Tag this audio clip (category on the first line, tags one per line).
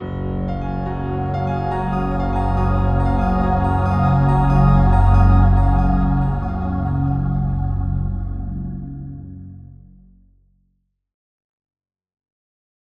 Music > Multiple instruments
musical-swell
epic-crescendo
new-game-theme
beautiful-crescendo
outro
riser
soothing-riser
powerful-riser
soothing-crescendo
new-game-music
intense-swell
podcast-intro
crescendo
start-new-game
powerful-crescendo
bright-crescendo
intro
begin-new-game
intense-riser
epic-riser
gentle-riser
podcast-outro